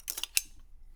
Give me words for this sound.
Sound effects > Objects / House appliances
knife and metal beam vibrations clicks dings and sfx-061
ting, Foley, Perc, Metal, ding, SFX, Vibration, Vibrate, FX, Wobble, Trippy, Klang, metallic, Beam, Clang